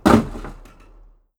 Sound effects > Objects / House appliances
FOLYProp-Blue Snowball Microphone, CU Item, Drop Into Trash Can Nicholas Judy TDC
Dropping an item into the trash can.